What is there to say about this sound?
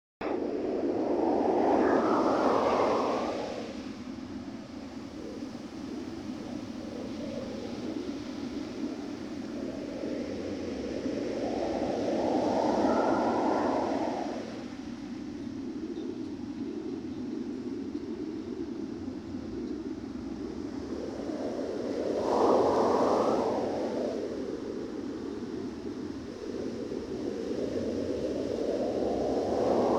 Soundscapes > Nature
Ocean sounds on a windy day in East London,South Africa.